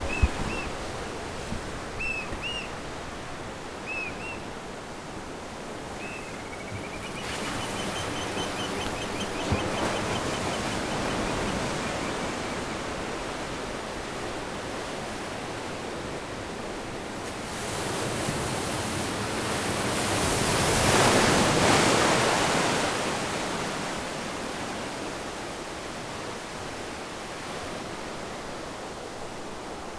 Soundscapes > Nature
Surf recorded from above and pretty near, in the old dock from Mollendo - Peru // Olas reventando, grabadas desde muy cerca, en la plataforma del antiguo muelle de Mollendo, en Peru
El muelle de Mollendo tiene una plataforma desde la cuál la gente suele hacer clavados, las olas pasan por debajo y pude grabarlas desde muy cerca Mollendo's dock has a platform that people use to practice diving. In winter, surf pass down the platform and I could record it from pretty near Recorded with the Zoom H6 with its MidSide microphone.
beach, close, dock, field-record, mid-side, ocean, sea, seagulls, surf, water, waves, winter